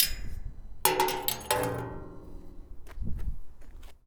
Sound effects > Objects / House appliances
Junkyard Foley and FX Percs (Metal, Clanks, Scrapes, Bangs, Scrap, and Machines) 92
Environment, Clank, waste, Perc, Metal, tube, Metallic, Clang, SFX, rubbish, rattle, Robot, FX, Atmosphere, Dump, trash, dumping, Bang, Ambience, Machine, dumpster, Robotic, Smash, Junk, scrape, Foley, garbage, Percussion, Junkyard, Bash